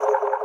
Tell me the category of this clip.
Sound effects > Electronic / Design